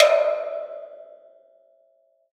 Instrument samples > Percussion
Chinese Opera SFX 3
Retouched a cow bell sample from phaseplant factory sample pcak with phase plant.
Effect, Percussion, SFX, China, Opera, Chinese, FX